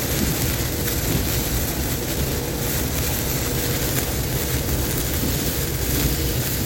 Sound effects > Natural elements and explosions
FRWKRec-Samsung Galaxy Smartphone, CU Sparkler Sparkling, Fuse Burning, Looped Nicholas Judy TDC
A sparkler sparkling. Could also work for a fuse burning. Looped.